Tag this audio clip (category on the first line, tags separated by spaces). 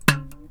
Sound effects > Objects / House appliances
clunk fieldrecording foley fx glass object stab